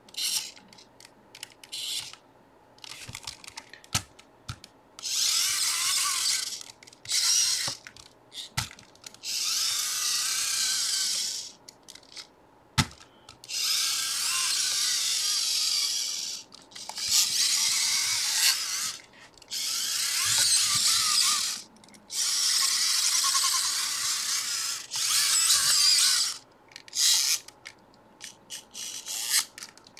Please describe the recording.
Sound effects > Other mechanisms, engines, machines
ROBTMvmt-CU Robot Servo Motors Nicholas Judy TDC
Robot servo motors in various effects, speeds and lengths.
Blue-brand, motor, robot, servo